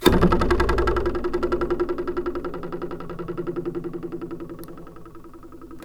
Sound effects > Other mechanisms, engines, machines
Handsaw Beam Plank Vibration Metal Foley 3
foley, fx, handsaw, hit, household, metal, metallic, perc, percussion, plank, saw, sfx, shop, smack, tool, twang, twangy, vibe, vibration